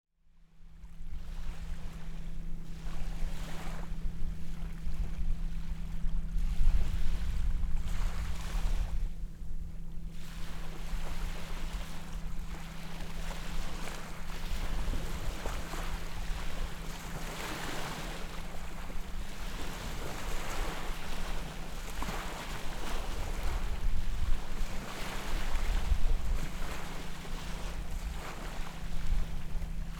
Soundscapes > Nature
Soft Waves With Slow Boat In Distance
Recorded with Zoom H6 XY-Microphone. Location: Aegina / Greece; placed on a stone on the beach close to the sand surface and the waves
beach, boat, field-recording, nature, ocean, sand, sea, water, waves